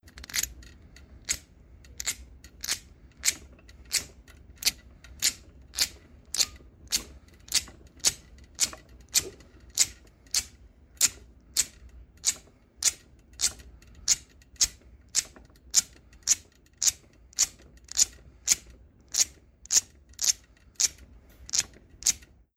Sound effects > Objects / House appliances
Trying to light with a lighter. Recorded at Johnston Willis Hospital.
Phone-recording, foley, light, lighter